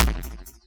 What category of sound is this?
Instrument samples > Synths / Electronic